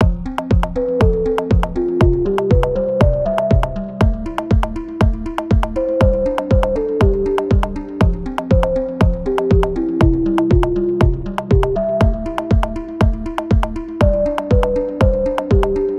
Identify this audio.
Music > Multiple instruments
Piano Drum Loop Disco Organic Melodic #002 at 120 bpm
A piano loop with drums in the background. It sounds to me a mix between disco, organic and melodic. The melody is from Piano Motifs from iPad.
120-bpm, 120bpm, beat, disco, drum, drum-loop, drums, groovy, loop, melodic, organic, percussion, percussion-loop, piano, rhythm